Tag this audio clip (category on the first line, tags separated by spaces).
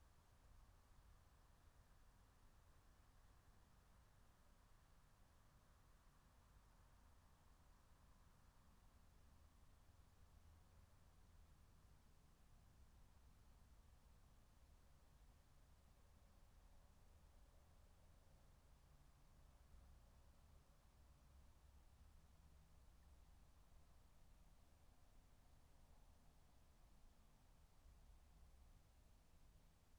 Soundscapes > Nature
phenological-recording alice-holt-forest soundscape field-recording natural-soundscape raspberry-pi meadow nature